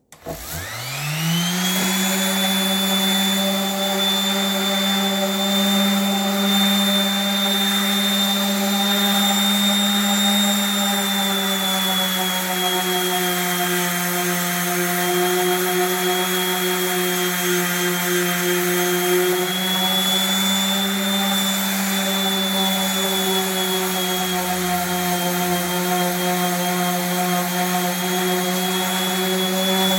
Sound effects > Objects / House appliances
TOOLPowr-Samsung Galaxy Smartphone, CU Electric Sander, Sanding On Wood Nicholas Judy TDC
A Chicago Electric Power Tools sander on wood.